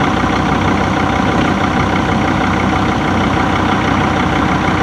Sound effects > Vehicles
djeep idle
Diesel Jeep Grand Cherokee idling in a parking lot. Loops nicely. Recorded with my phone.
car, diesel, engine, idle, motor, vehicle